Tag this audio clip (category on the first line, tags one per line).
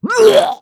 Sound effects > Human sounds and actions
Hurt
Scream